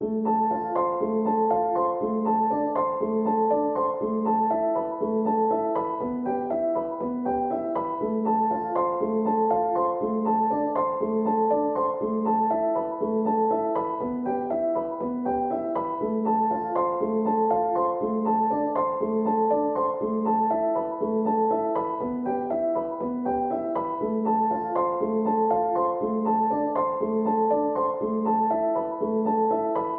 Music > Solo instrument
Piano loops 194 octave short loop 120 bpm
120, 120bpm, free, music, piano, pianomusic, reverb, samples, simple, simplesamples